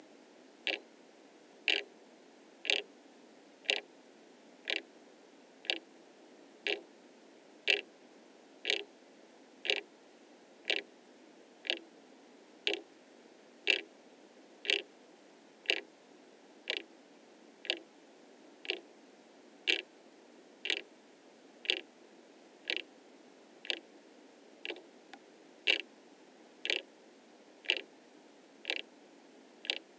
Sound effects > Other mechanisms, engines, machines
Its an old Jura wall clock from the 1970s. Made in Switzerland. Heirloom from grandfather.
anxiety, Clock, tac, tic, Ticking, time, wall-clock